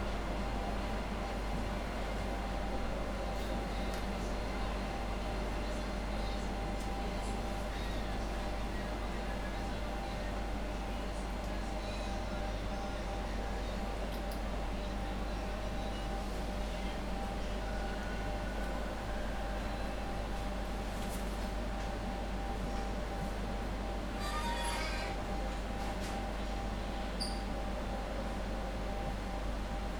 Soundscapes > Urban
Recording the moment when the train is stopped.